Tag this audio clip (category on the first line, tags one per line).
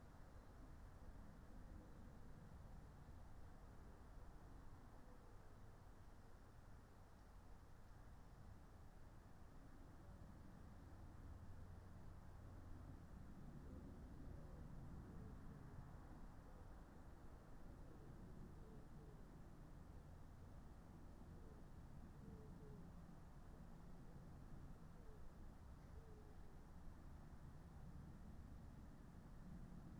Soundscapes > Nature
alice-holt-forest
artistic-intervention
Dendrophone
nature